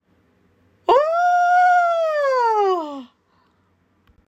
Speech > Solo speech
High pitch female voice saying "oh" in a happy and a bit surprised way, as when you get good news. It's my own voice, simply recorded with my iphone.
female, happiness, joy, oh, surprise, voice, woman